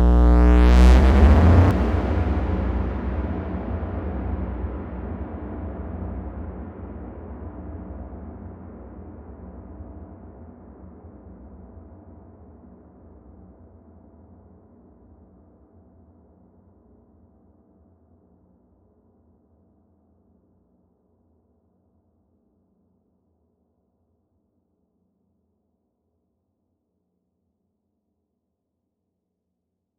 Sound effects > Other mechanisms, engines, machines
sfx2 = Frequency Rising with Heavy Reverb
I built a Wien Bridge oscillator one day out of idleness and a craving for solder smoke. Then, I recorded it. Some of the transitional moments I found dramatic. I also felt reverb-dramatic, so I added a lot.
bizarre, enormous, echo